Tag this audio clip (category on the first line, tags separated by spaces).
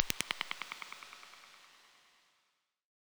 Instrument samples > Synths / Electronic
IR Delay Analog Echo